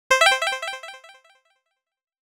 Sound effects > Electronic / Design
GAME UI SFX PRACTICE 7
Program : FL Studio, NES Pulse